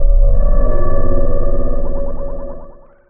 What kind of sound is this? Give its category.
Instrument samples > Synths / Electronic